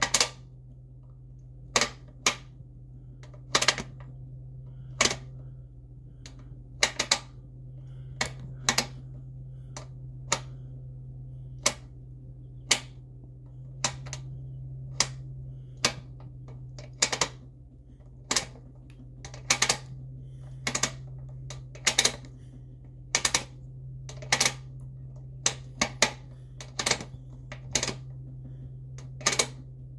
Sound effects > Objects / House appliances
MECHRtch-Samsung Galaxy Smartphone, CU Crockpot, Ratchet Dial, Turning Nicholas Judy TDC
A crockpot ratchet dial turning.
crockpot, dial, foley, Phone-recording, ratchet, turn